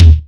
Instrument samples > Percussion
This tom is part of the Tama Star Classic Bubinga Tomset (every tom is in my tom folder). I uploaded the attacked and unattacked (without attacks) files. • tom 1 (hightom): 9×10" Tama Star Classic Bubinga Quilted Sapele • tom 2 (midtom): 10×12" Tama Star Classic Bubinga Quilted Sapele • tom 3 (lowtom): 14×14" Tama Star Classic Bubinga Quilted Sapele → floor 1 (lightfloor): 16×16" Tama Star Classic Bubinga Quilted Sapele • floor 2 (deepfloor): 14×20" Tama Star Classic Bubinga Gong Bass Drum tags: tom tom-tom Tama-Star Tama bubinga sapele 16x16-inch 16x16-inches bubinga death death-metal drum drumset DW floor floortom floortom-1 heavy heavy-metal metal pop rock sound-engineering thrash thrash-metal unsnared Pearl Ludwig Majestic timpano
16x16-inch, 16x16-inches, bubinga, death, death-metal, drum, drumset, DW, floor, floortom, floortom-1, heavy, heavy-metal, Ludwig, Majestic, metal, Pearl, pop, rock, sapele, sound-engineering, Tama, Tama-Star, thrash, thrash-metal, timpano, tom, tom-tom, unsnared